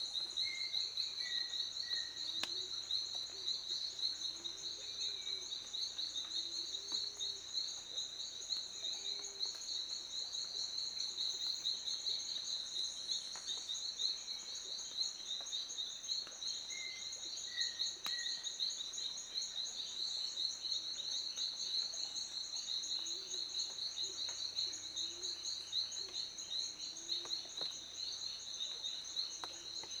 Soundscapes > Nature
Late morning in a Panamanian rainforest. Some persistent insects and a few birds. Water drops fall on leaves.
ambience, tropical, field-recording, forest, soundscape, birds, outside, water, relaxing, nature, insects, drip